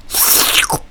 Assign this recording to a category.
Sound effects > Human sounds and actions